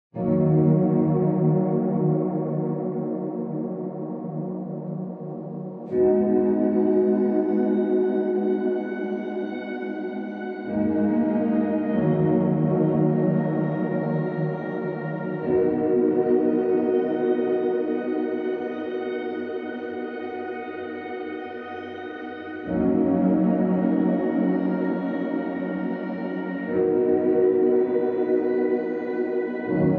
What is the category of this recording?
Music > Multiple instruments